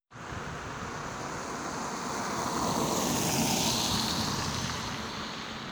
Sound effects > Vehicles
tampere car26
automobile, car, vechicle